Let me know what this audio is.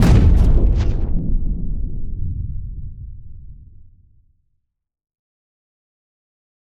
Sound effects > Other
Sound Design Elements Impact SFX PS 123
audio, blunt, cinematic, collision, crash, design, effects, explosion, force, game, hard, heavy, hit, impact, percussive, power, rumble, sfx, sharp, shockwave, smash, sound, strike, thudbang, transient